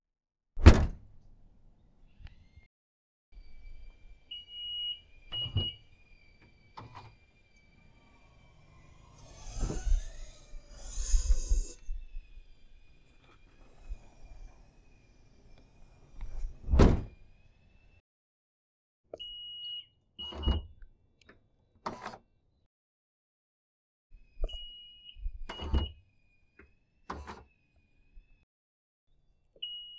Sound effects > Vehicles
van trunk open and closing sounds
Sound recordings of a van trunk oepning and closing sound.
auto, automobile, automobiles, car, close, closing, door, doors, foley, impact, machine, machinery, mech, mechanical, metal, mobile, open, opening, shut, slam, trunk, van